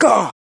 Speech > Solo speech
Random Brazil Funk Volcal Oneshot 6
Recorded with my Headphone's Microphone, I was speaking randomly, and tightened my throat. I even don't know that what did I say，and I just did some pitching and slicing works with my voice. Processed with ZL EQ, ERA 6 De-Esser Pro, Waveshaper, Fruity Limiter.
BrazilFunk, EDM, Volcal